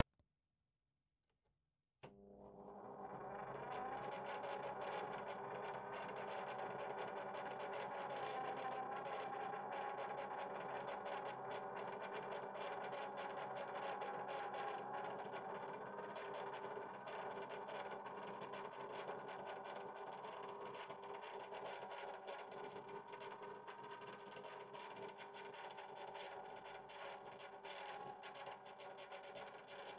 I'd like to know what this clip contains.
Sound effects > Objects / House appliances
A broken fan heater, On, working and Off. I was using DJI Mic 2 for this recording.